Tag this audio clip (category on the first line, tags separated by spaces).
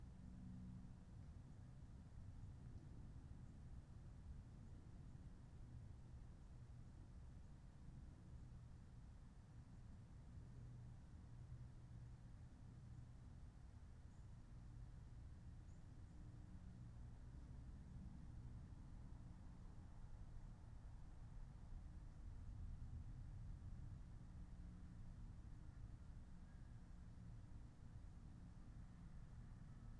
Soundscapes > Nature
artistic-intervention modified-soundscape nature phenological-recording raspberry-pi sound-installation weather-data